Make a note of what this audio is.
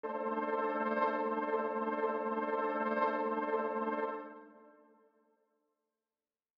Instrument samples > Synths / Electronic
A simple ambient chord
dreamlike, echo, panning, reverb